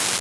Instrument samples > Synths / Electronic
A databent open hihat sound, altered using Notepad++